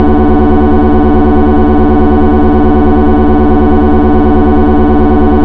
Sound effects > Other mechanisms, engines, machines
EMD 567 engine notch 5 (synth recreation)
This sound is great for train simulators!